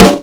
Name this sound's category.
Instrument samples > Percussion